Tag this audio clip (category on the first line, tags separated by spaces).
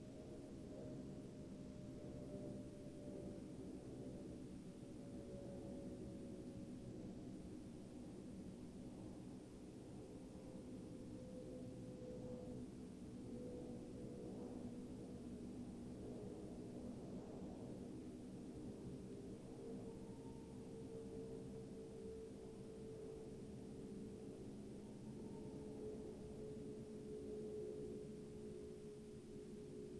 Soundscapes > Nature
weather-data alice-holt-forest natural-soundscape nature field-recording artistic-intervention sound-installation Dendrophone raspberry-pi soundscape data-to-sound